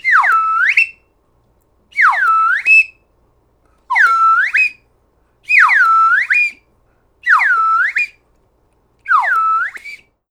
Sound effects > Objects / House appliances
TOONWhis-Blue Snowball Microphone, CU Slide, Down, Up Nicholas Judy TDC
A slide whistle down and up.
cartoon, slide-whistle, up, whistle